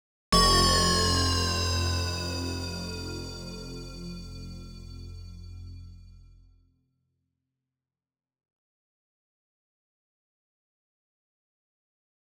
Sound effects > Electronic / Design
Stingers and Stabs 002
A retro 1980s style sting / stab (or stinger) to use for an accent, transition, or FX. I would love to hear how you put the sound to work.
sting, metal, horror, impact, hard, fiction, surprise, powerful, industrial, effect, stinger, heavy, retro, drama, stab, startle, fx, sound, science, short, 1980s, hit